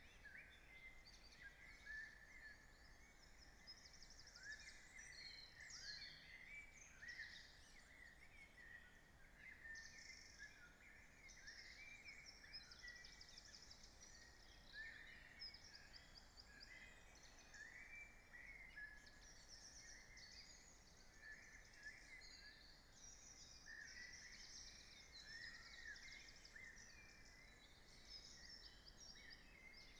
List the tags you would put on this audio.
Soundscapes > Nature
data-to-sound
alice-holt-forest
Dendrophone
raspberry-pi
phenological-recording
sound-installation
field-recording
artistic-intervention
modified-soundscape
nature
soundscape
weather-data
natural-soundscape

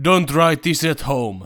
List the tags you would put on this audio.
Speech > Solo speech
male
warning
calm
speech
english
voice

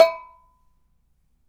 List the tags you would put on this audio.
Sound effects > Objects / House appliances
glass
metal
object
clunk

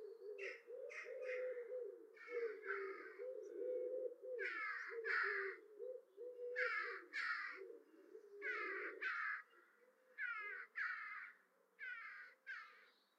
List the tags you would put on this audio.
Sound effects > Animals
jackdaw
field-recording
Garden
birds
pigeon

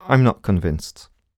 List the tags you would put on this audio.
Speech > Solo speech
dialogue
doubt
FR-AV2
Human
Male
Man
Mid-20s
Neumann
NPC
oneshot
singletake
Single-take
skeptic
skepticism
talk
Tascam
U67
unconvinced
Video-game
Vocal
voice
Voice-acting

